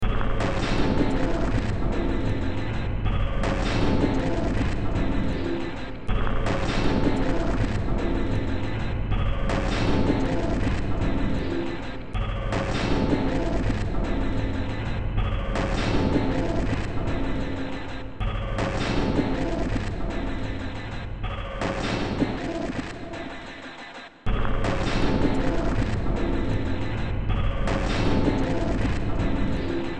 Music > Multiple instruments
Demo Track #3548 (Industraumatic)
Games, Industrial, Noise